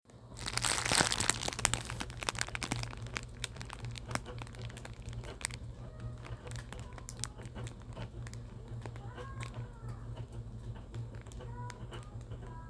Sound effects > Objects / House appliances
We were wrapping presents for my daughter's birthday and I decided to stop and ball up the scrap cellophane wrap. This sound was recorded using a Samsung Galaxy S23+ with the mobile app "WaveEditor" and finessed it with Adobe Audition.